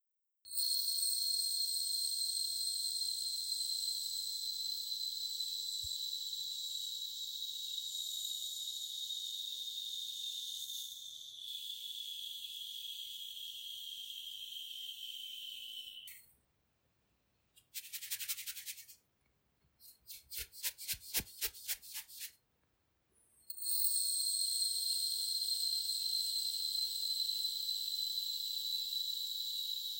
Sound effects > Objects / House appliances
Hourglass - Sand flow light
A decorative hourglass with sand flowing downwards. It is very small, only 20 seconds. * No background noise. * No reverb nor echo. * Clean sound, close range. Recorded with Iphone or Thomann micro t.bone SC 420.
clock, desert, effect, experimental, fantasy, fx, game-sound, hourglass, magic, magical, magician, oasis, persian, princeofpersia, reverse, rpg, sand, sands, sorcery, spell, tick-tock, tictac, time, waves, witch, wizard